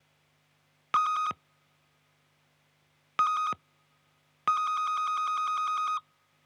Sound effects > Electronic / Design
guitar Delay feedback
a sound made with a guitar
feedback, harmonic, soundscape